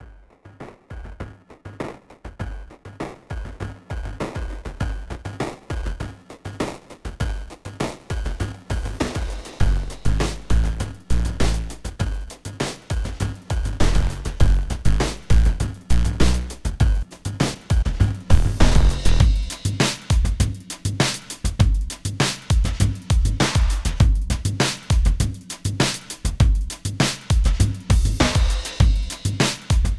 Music > Multiple instruments
Slowly Evolving/Crescendoing Beat (16 Bars, 100 bpm)
crescendo, 100-bpm-drum-beat, ambient-evolving-beat, evolving-beat, 100-bpm-drum-loop